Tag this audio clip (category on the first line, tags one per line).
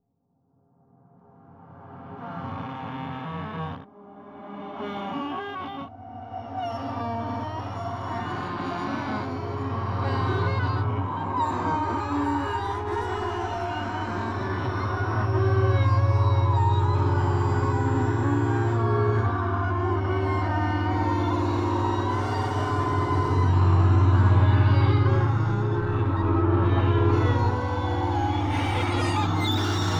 Sound effects > Electronic / Design
digital
riser
space
sfx
FX
experimental